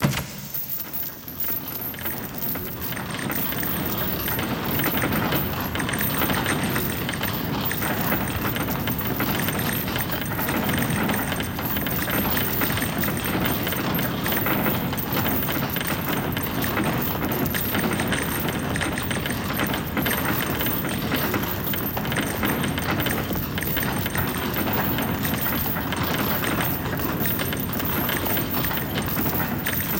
Sound effects > Other mechanisms, engines, machines
Heavy Chain&Gears Moving1(Reverbed)
Hi! That's not recordedsound :) I synthed it with phasephant!